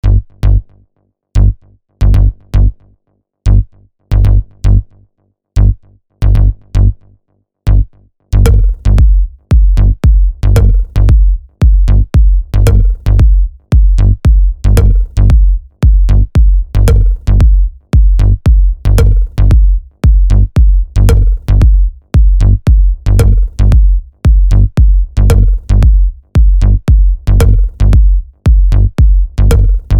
Music > Multiple instruments
drums bass 114bpm A simple composition I made with nexus. This composition is fantastic. Ableton live.
drum, beat, bass, 114bpm, drums, Synth